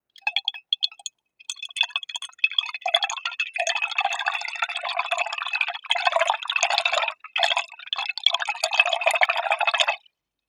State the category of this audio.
Sound effects > Objects / House appliances